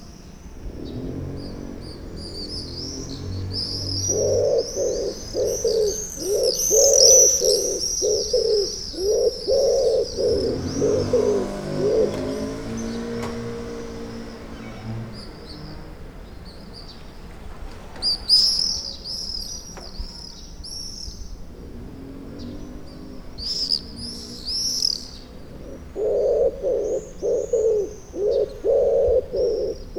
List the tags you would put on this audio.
Sound effects > Animals
81000
Albi
Bird
City
France
FR-AV2
Hypercardioid
July
MKE-600
MKE600
morning
Occitanie
Sennheiser
Shotgun-mic
Shotgun-microphone
Single-mic-mono
Tarn
Tascam

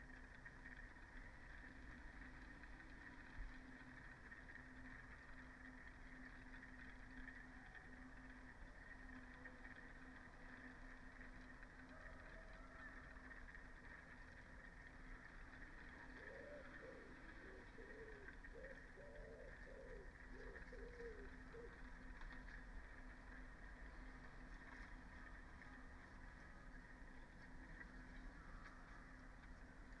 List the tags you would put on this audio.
Soundscapes > Nature
data-to-sound
Dendrophone
phenological-recording
natural-soundscape
nature
field-recording
modified-soundscape
sound-installation
artistic-intervention
alice-holt-forest
soundscape
raspberry-pi
weather-data

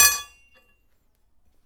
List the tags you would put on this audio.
Sound effects > Other mechanisms, engines, machines
bang
percussion
foley
shop
strike
wood
boom
bop
bam
knock
perc

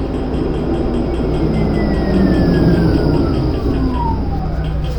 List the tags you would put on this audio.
Sound effects > Vehicles
tramway
vehicle

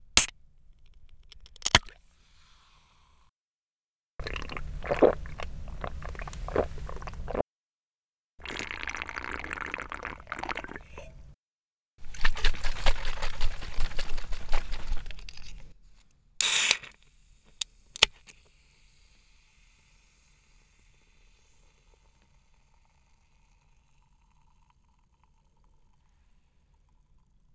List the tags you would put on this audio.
Sound effects > Objects / House appliances
slurping
drink
drinking
sipping
soda

